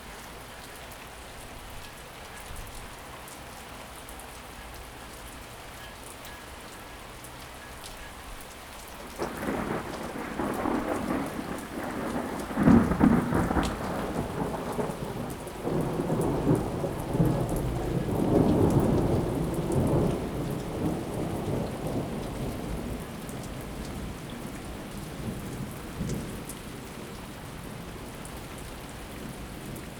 Soundscapes > Nature
Rain and thunder recorded with a Zoom H2n, at 7:30 AM.